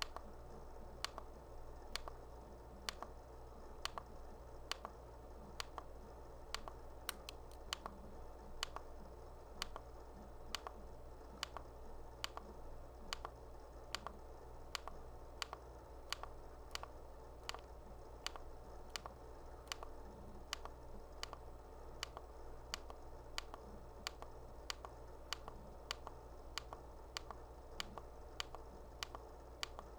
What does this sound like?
Sound effects > Objects / House appliances
A Fuji Instax Mini 9 camera button clicking. No servo motor.